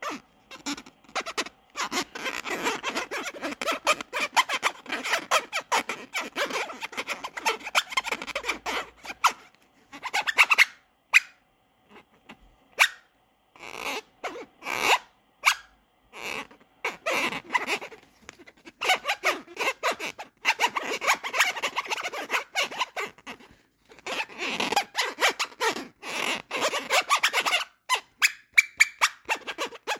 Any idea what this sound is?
Sound effects > Objects / House appliances
Squeaking and jabbering.